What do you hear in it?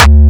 Instrument samples > Percussion
Classic Crispy Kick 1- +1octD
brazilianfunk, crispy, distorted, Kick, powerful, powerkick